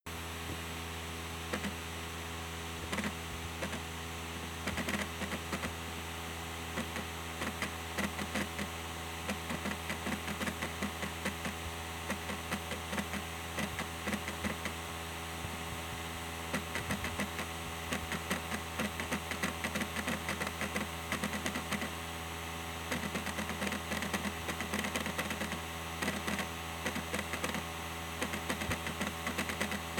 Sound effects > Objects / House appliances
DOS on a laptop copying files from a CD disc, the rhytmic clicking making it sound more like a server. Recorded with my phone.
cd, click, computer, disc, disk, drive, dvd, machine, motor, pc, server